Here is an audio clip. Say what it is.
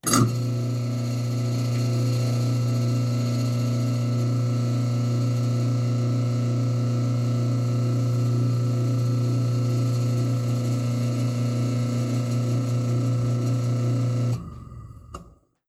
Sound effects > Objects / House appliances
MACHAppl-Samsung Galaxy Smartphone, CU Garburator, Turn On, Run, Off Nicholas Judy TDC
A garburator turning on, running and turning off.
Phone-recording, turn-off